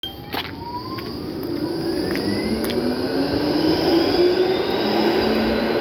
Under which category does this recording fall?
Soundscapes > Urban